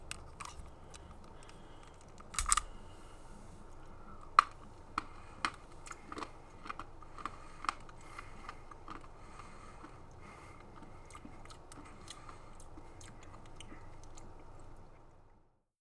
Sound effects > Human sounds and actions
A PEZ candy dispenser dispensing PEZ candy and eating PEZ candy.